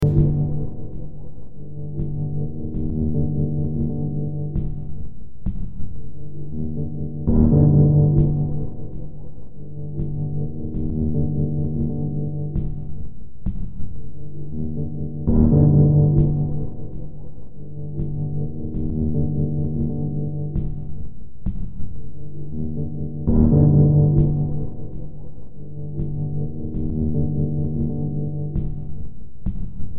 Soundscapes > Synthetic / Artificial
Looppelganger #186 | Dark Ambient Sound
Use this as background to some creepy or horror content.
Ambience, Ambient, Darkness, Drone, Games, Gothic, Hill, Horror, Noise, Sci-fi, Silent, Soundtrack, Survival, Underground, Weird